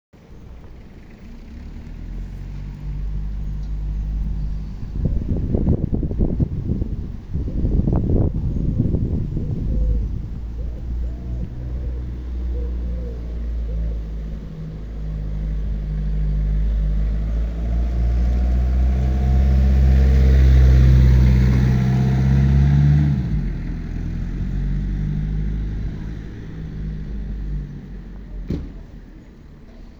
Soundscapes > Urban
20250514 1313 cars 2 phone microphone
atmophere, field, recording